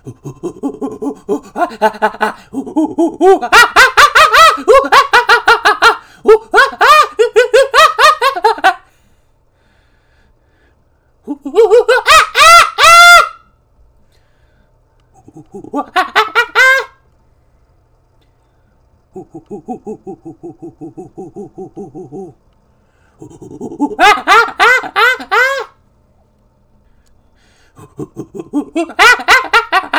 Sound effects > Animals
A monkey chattering. Human imitation. Cartoon